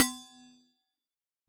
Sound effects > Objects / House appliances
Resonant coffee thermos-002
percusive, recording, sampling